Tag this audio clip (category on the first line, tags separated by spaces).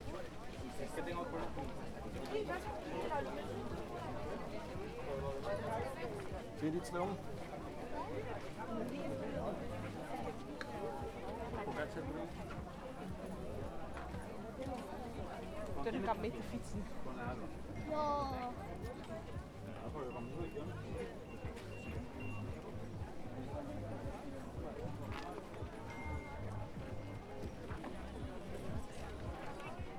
Soundscapes > Urban
Tascam-DR-40X spanish chatter voices street field-recording market people